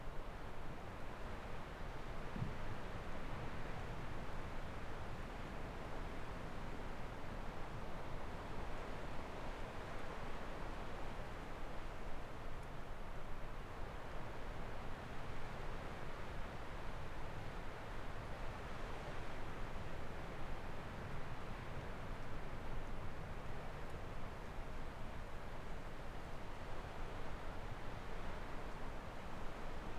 Soundscapes > Nature
Recorded 15:14 03/01/26 A bit before the viewpoint whose promontory separates the waves to the right and left of the cliff. After the first runner passes, a common cicada or grasshopper I can’t identify begins sounding. At the end a couple of runners stop to take photos. Also a sparrow calling now and then. Zoom H5 recorder, track length cut otherwise unedited.